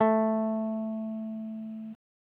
Instrument samples > String
Random guitar notes 001 A3 01
electricguitar, Guitar